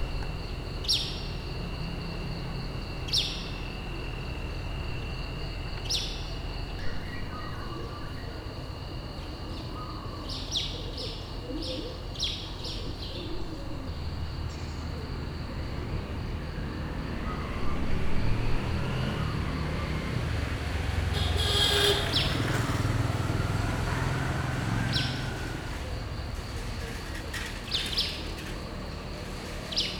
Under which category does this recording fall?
Soundscapes > Nature